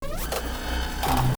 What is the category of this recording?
Sound effects > Electronic / Design